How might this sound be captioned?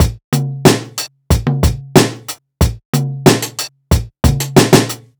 Music > Other
hip hop twin 92 bpm
beat, drum-loop, drums, groovy, hiphop, loop, percussion-loop, quantized